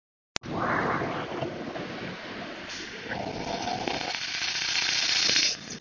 Soundscapes > Urban
Bus leaving 3 5
Where: Pirkkala What: Sound of a bus leaving a bus stop Where: At a bus stop in the morning in a calm weather Method: Iphone 15 pro max voice recorder Purpose: Binary classification of sounds in an audio clip
bus, traffic, bus-stop